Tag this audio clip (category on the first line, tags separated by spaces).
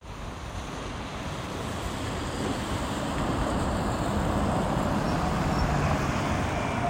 Soundscapes > Urban
vehicle bus